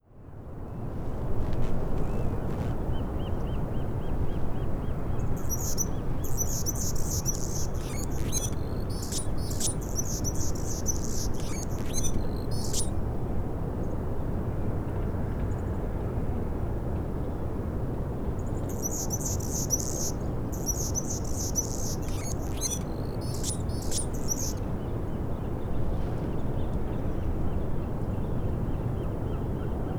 Soundscapes > Nature
Hummingbird song. Los Osos, California
birds, field-recording, hummingbird